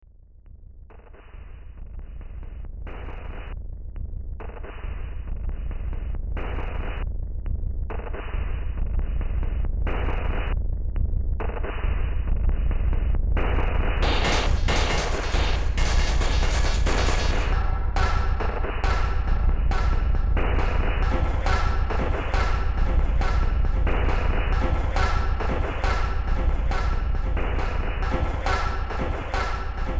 Music > Multiple instruments
Demo Track #3631 (Industraumatic)
Games, Horror